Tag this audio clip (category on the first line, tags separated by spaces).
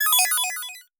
Sound effects > Electronic / Design
coin game-audio tonal pitched pick-up designed high